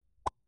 Sound effects > Objects / House appliances
stickman whoosh movement (medium version)
For animation that have fast movement (object that used for producing this sound: hanger)
effect, movement, sound, stickman, whoosh